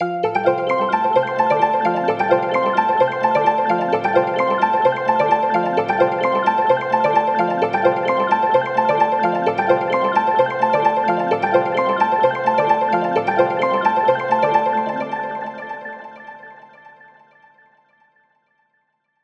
Music > Solo instrument
Melody Loop-Botanica Melody 2-C Maj 130bpm
Synthed with phaseplant only. Processed with Disperser and Slice EQ